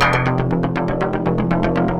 Music > Solo percussion
Industrial Estate 48
120bpm, Ableton, chaos, industrial, loops, soundtrack, techno